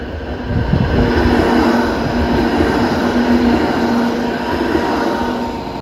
Soundscapes > Urban
ratikka7 copy
traffic, vehicle, tram